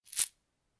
Sound effects > Natural elements and explosions
Recorded on 12.01.2026 ____ actually the sound of a small bottle of pills.
pills, trade, coin, cash, money, gold, coins, pay